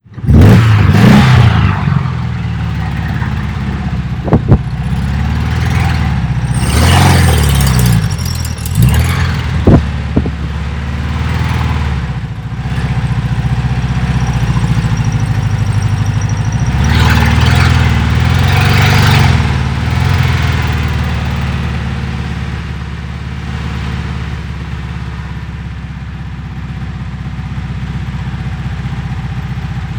Sound effects > Vehicles
PORSCHE 912 engine sound after 9 years of restauration (imperfect)
A field-recording of a Porsche 912 after 9 years of restauration, recorded with an Audio-Technica AT2020 into Ableton, windy day (wind-pops @ 0:04 , 0:09-0:10)
912, Engine, oldtimer, Porsche, raw, restauration